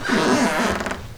Sound effects > Objects / House appliances
Creaking Floorboards
bare-foot, creaking, creaky, floor, floorboard, floorboards, flooring, footstep, footsteps, going, grate, grind, groan, hardwood, heavy, old, old-building, room, rub, scrape, screech, squeak, squeaking, squeaky, squeal, walk, walking, weight, wood, wooden